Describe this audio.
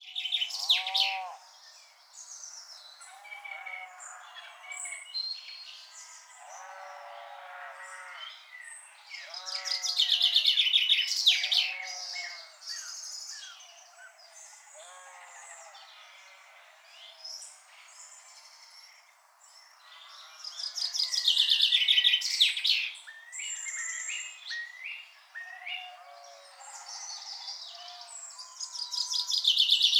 Soundscapes > Nature
An edited recording at RSPB Campfield Marsh using RX11.
ambience, birds, sheep